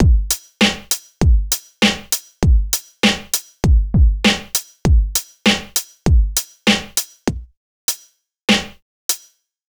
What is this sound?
Music > Solo percussion
99bpm - Beat Drum - Master
A Good Old Fashion Beat. Easy, but efficient!
percussion, kick, beat, sample, snare, drum, master